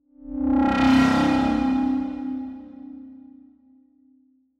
Sound effects > Electronic / Design
This sound was made and processed in DAW; - Super scary sting sound effect, made with distortion layering technique on two oscillators. Sounds like some alien invasion i guess. - Ы.